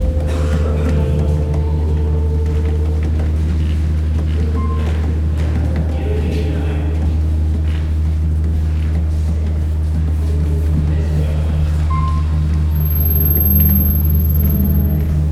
Soundscapes > Indoors
Steps on the Floor and Blips - Biennale Exhibition Venice 2025
The sound of foot steps and some high frequency blips Sound recorded while visiting Biennale Exhibition in Venice in 2025 Audio Recorder: Zoom H1essential
biennale, blip, exhibition, field-recording, floor, granular, low-frequency, museum, step